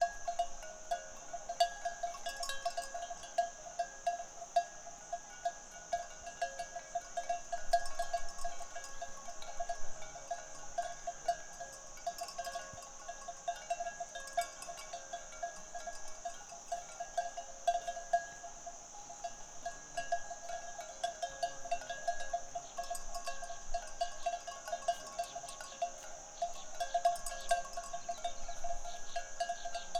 Soundscapes > Nature
Bells and Cows, Lod Cave, Thailand (March 10, 2019)
Recording of cow bells and ambient animal sounds near Lod Cave, Thailand. Peaceful rural environment with occasional distant echoes.
peaceful, Thailand, Lod, animals, field, recording, cows, rural, bells, echo, Cave, ambient